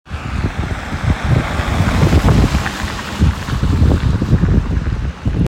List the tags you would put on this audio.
Sound effects > Vehicles
automobile car outside vehicle

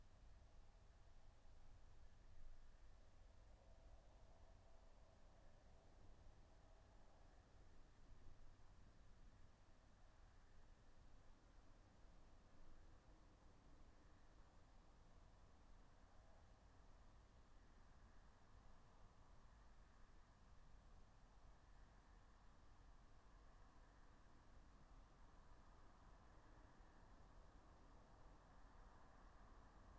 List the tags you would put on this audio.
Soundscapes > Nature
alice-holt-forest nature field-recording natural-soundscape meadow soundscape

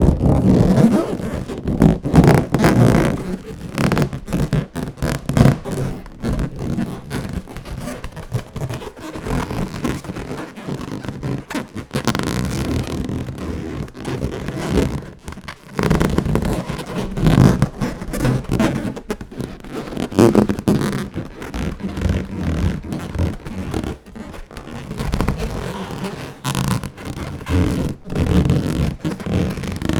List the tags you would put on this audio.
Sound effects > Objects / House appliances
field-recording inflate balloon plastic Inflatable

